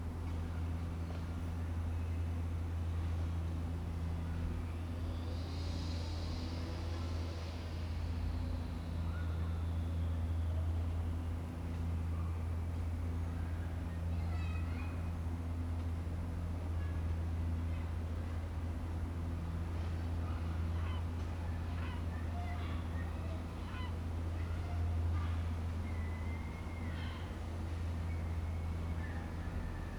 Soundscapes > Urban
Summer Evening in a Residential Area of St Petersburg
Ремонт улицы возле 539 школы Санкт-Петербурга. Спальный район, 25 июля 2025 года Street repair near School No. 539 in St. Petersburg.Residential area, recorded on July 25th, 2025 using ZOOM IQ7.
Russia, City, Urban, Street, Saint-Petersburg